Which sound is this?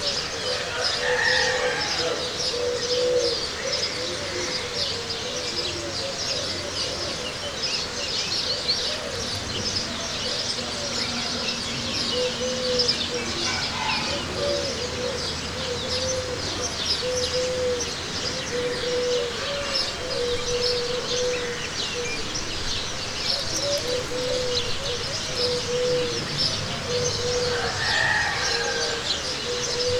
Soundscapes > Nature
Birds village Azores
birds in Agua de Alto, Azores
birds, nature